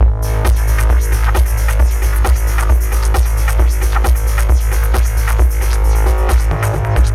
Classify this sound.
Music > Solo percussion